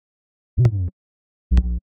Sound effects > Electronic / Design
Optical Theremin 6 Osc Shaper Infiltrated-023
DIY, SFX, Noise, Alien, Oscillator, Saw, strange, Impulse, Loopable, Robotic, Robot, FX, Chaotic, Synth, IDM, Electro, Tone, Crazy, Experimental, EDM, Gliltch, Pulse, Analog, Weird, Otherworldly, Electronic, Machine, Mechanical, Theremin